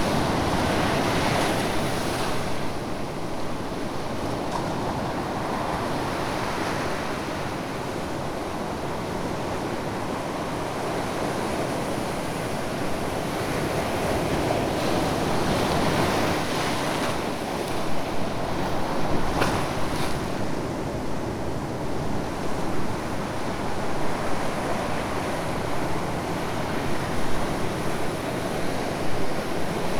Sound effects > Natural elements and explosions
Waipatiki Beach 24 August 2025 Tascam dr100mk3 2
I recorded this sound at Waipatiki Beach in New Zealand, using my Tascam Dr100 Mk3. It was quite a windy day, so even though I had my wind protector on some of the audio sounds unstable. However overall I believe it is still a great sound.